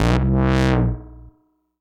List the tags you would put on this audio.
Sound effects > Experimental
retro electro machine complex bassy sweep synth

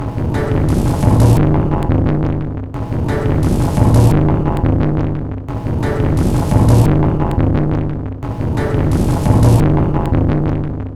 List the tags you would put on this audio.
Instrument samples > Percussion
Packs Alien Drum Samples Loopable Ambient Underground Loop Soundtrack Industrial Weird Dark

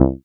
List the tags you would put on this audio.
Instrument samples > Synths / Electronic
bass fm-synthesis

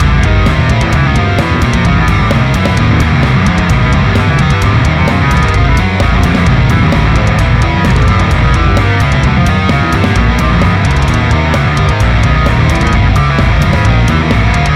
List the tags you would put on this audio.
Music > Multiple instruments
chromatic whimsical playful lively disharmony frolicky atonal disharmonic nontonal non-harmonic dance non-tonal frolicsome tonal-instability failure dissonance ambiguity discord mischievous chromaticism cacophony uninteresting distonal happy avant-gardism jaunty death-metal atonality fly

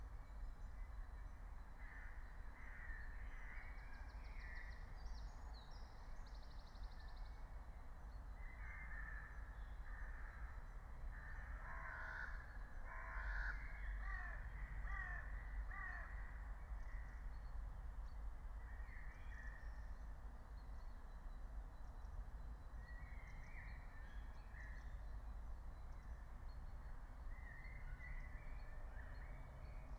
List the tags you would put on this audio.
Soundscapes > Nature
phenological-recording field-recording natural-soundscape nature alice-holt-forest meadow soundscape raspberry-pi

